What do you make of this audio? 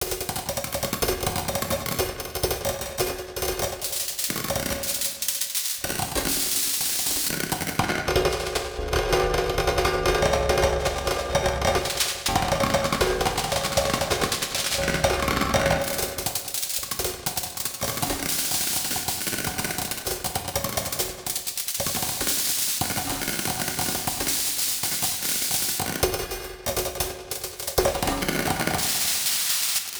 Soundscapes > Other
Sand and Rain Texture from Granular Synthesis
This sounds like rain and sand hitting a metallic plate Created by recording vinyl crackings and adding modulated noise and bit rate reduction Reverb and delay after
ambient, atmosphere, background, experimental, metallic, rain, soundscape, synthetic, texture